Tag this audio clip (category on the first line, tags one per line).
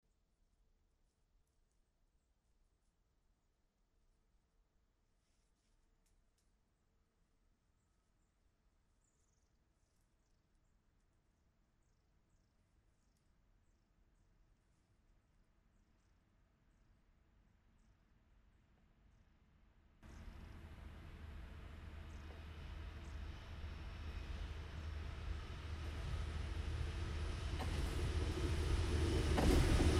Sound effects > Other
railway
train
trains